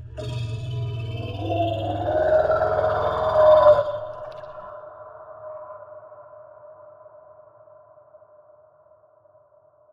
Sound effects > Experimental
Creature Monster Alien Vocal FX
visceral, boss, Creature, Deep, Monster, gutteral, Sounddesign, Vox, scary, Animal, Growl, demon, Sound, Otherworldly, Snarling, Fantasy, Alien, Groan, Monstrous, Ominous, Reverberating, sfx, devil, Snarl, evil, gamedesign, fx, Echo, Vocal, Frightening